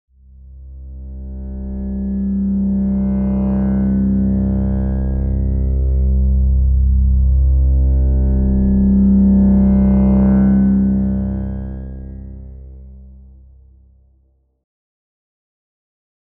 Instrument samples > Synths / Electronic
Deep Pads and Ambient Tones29
Ambient, Analog, bass, bassy, Chill, Dark, Deep, Digital, Haunting, Note, Ominous, Oneshot, Pad, Pads, Synth, Synthesizer, synthetic, Tone, Tones